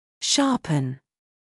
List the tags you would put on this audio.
Speech > Solo speech
voice english

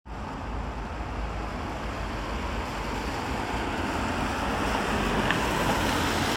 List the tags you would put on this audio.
Sound effects > Vehicles
car vehicle

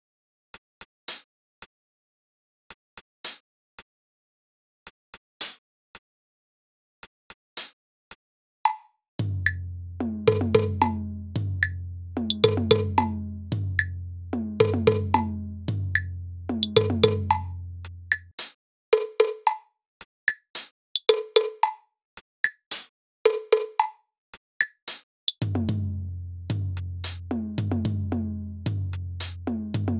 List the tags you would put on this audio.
Soundscapes > Synthetic / Artificial
fusion sample pattern

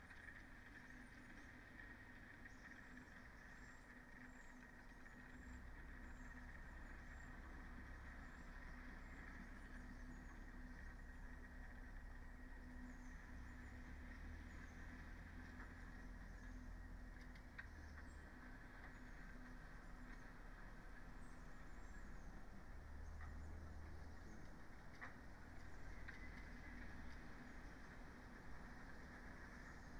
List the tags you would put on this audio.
Soundscapes > Nature
Dendrophone raspberry-pi natural-soundscape artistic-intervention sound-installation

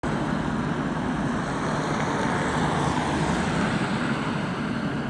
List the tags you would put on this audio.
Soundscapes > Urban
driving tyres city car

